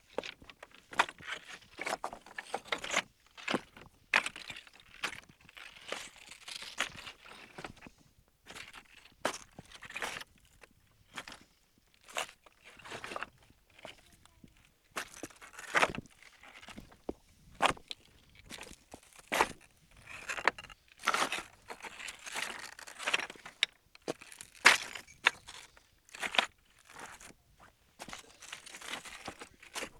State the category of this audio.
Soundscapes > Other